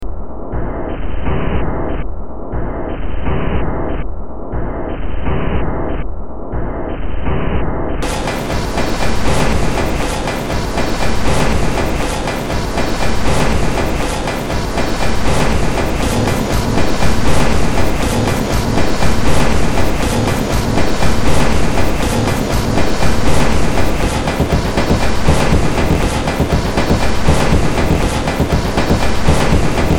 Music > Multiple instruments
Demo Track #3918 (Industraumatic)
Cyberpunk, Noise, Industrial, Horror, Ambient, Soundtrack, Underground, Sci-fi, Games